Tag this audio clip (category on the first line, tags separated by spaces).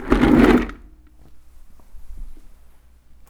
Sound effects > Objects / House appliances
foley industrial sfx glass bonk perc foundobject metal fx fieldrecording natural hit